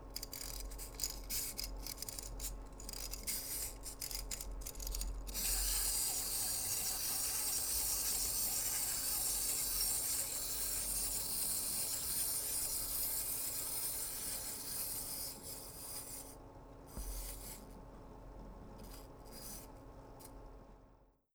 Sound effects > Objects / House appliances
A toy robot being wounded up and then it walks.